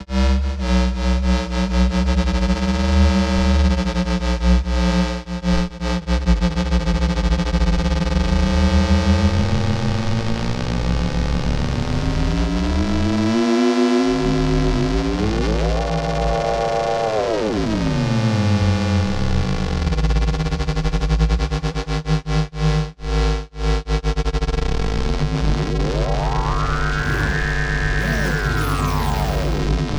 Sound effects > Electronic / Design
Portal Warp Ring Mod Sci-Fi Bass Warble <3
A well-tailored subharmonic exploration in ring modulation and stereo effects. Created in FL Studio and Reaper using VST's PhasePlant, Pigments, Low-tility, Freak, Fab Filter Pro-C, etc. Like a Spectral Phase Shifter of sorts. Use it as a bass drop in DJ Production, maybe as a SFX for a videogame, or just turn it on full blast to watch things fall off of your walls and annoy your neighbors. enjoy~
abstract, bass, bass-drop, drop, dub, dubstep, edm, effect, electronic, freaky, fx, glitch, glitchy, harmonic, low, low-end, mod, modulated, ring, ringmod, sfx, sound-design, sub, subharmonic, wamp, warble, wobble, wub